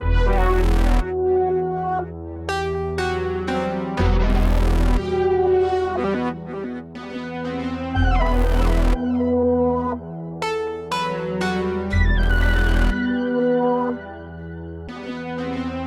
Music > Multiple instruments
Dark trap beat, inspired in star wars aesthetics and synths. Part of a whole beat. AI generated: (Suno v4) with the following prompt: generate a dark and aggressive beat, with intense percussion and bass 808 and inspired in star wars synths and other-worldly sounds. Do it in 120 BPM and B minor.
120bpm, ai-generated, hiphop, rap, starwars, trap